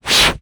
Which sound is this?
Sound effects > Human sounds and actions
Blowing - Dji-Mic3
Subject : A dude blowing, one side is le blowing on the mic the other is off to the side. It had collected basement dust after a recording :) Date YMD : 2025 October 31 Location : Albi 81000 Tarn Occitanie France. Hardware : Dji Mic 3. Two mono recordings but not intended as a stereo setup. Weather : Processing : Trimmed and normalised in Audacity.
psst, blowing, Blow, dual-mono, dji-mic3, dji, wind, dude, pshew, synced-mono